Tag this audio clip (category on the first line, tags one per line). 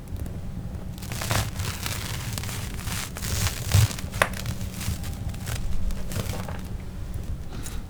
Sound effects > Natural elements and explosions
FR-AV2
halloween
NT5
Rode
spider-web
spooky
Tascam
web
webbing